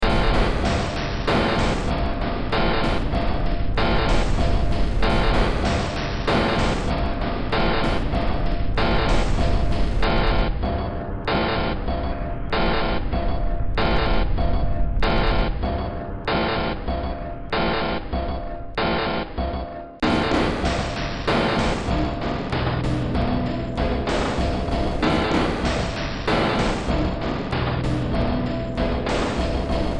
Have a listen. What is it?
Music > Multiple instruments
Demo Track #3007 (Industraumatic)
Underground, Industrial, Horror, Soundtrack, Ambient, Sci-fi, Cyberpunk, Noise, Games